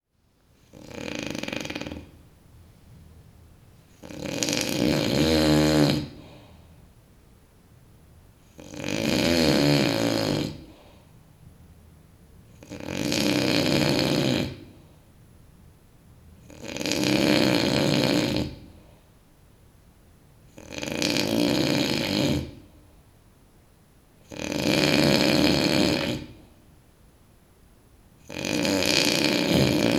Sound effects > Human sounds and actions
Aggresive male snoring #1
The first of 2 aggressive male snoring audio recordings.
loud, aggressive, male, human, rattling, snoring